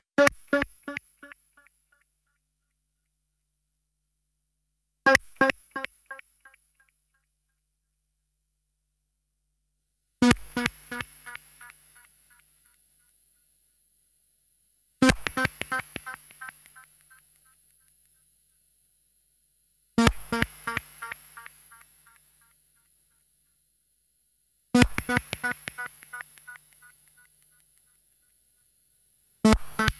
Sound effects > Experimental
Metal Reverberations, Echoes and delays
Experimental texture out of the recording of a metallic sound and its harmonics. Produced with Digitakt 2
clang, delay, echo, experimental, iron, metal, metallic, reverb, rod, steel, ting